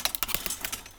Sound effects > Other mechanisms, engines, machines
metal shop foley -170
tools, oneshot, rustle, bang, knock, little, tink, foley, wood, pop, metal, bam, sfx, bop, percussion, crackle, fx, sound, strike, perc, thud, shop, boom